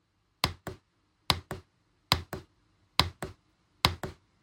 Sound effects > Other
Click sound effect 3
This sound is completely free and you can use it in any way you like.
click, effect, game, gamesound, gamesoundeffect, sfx, sound, tik, tok